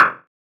Instrument samples > Percussion
IDM Percussion
Hi ! That's not recording sound :) I synth it with phasephant!
Clap, EDM, IDM, Industry, Instrument, Percussion